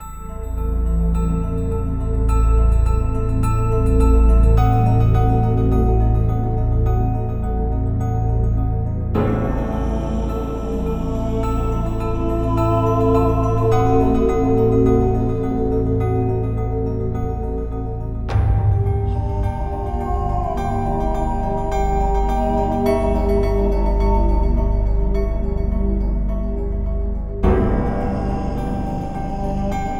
Music > Multiple instruments
Horror Texture (Witchwood) #1
spooky, horror-music-loop